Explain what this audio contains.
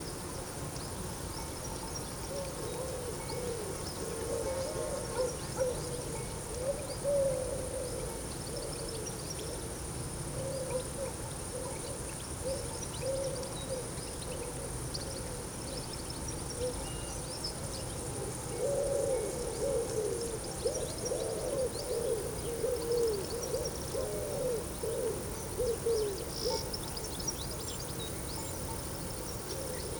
Soundscapes > Nature
la vilaine summer meadow pm2
Large Meadow in a sunny summer afternoon in a swarmy field, near the river "La vilaine". Insects as flies and orthoptera, birds are (according to Merlin): wood pigeon, turkish turtle, goldfinch, zitting cisticola, ...), a small shepp's bell, and other discreet presences (bike, faraway voices, buzzard,...). Rich and lively ambiance.